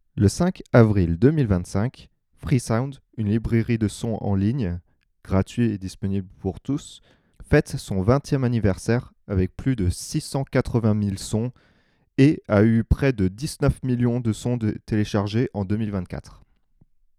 Speech > Solo speech
Using a Tascam FR-AV2 and Shure SM58 Made by a half French half british Male in his late 20s. 2025 May 06
Anniversary, France, Tascam